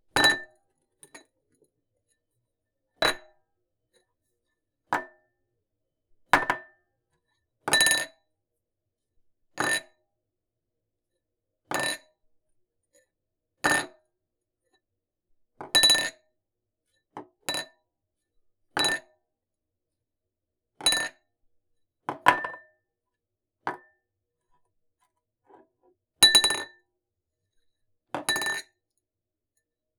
Sound effects > Objects / House appliances
thin glass b
Picking up and dropping a small glass on a countertop multiple times.
clink, table, drop, impact, glass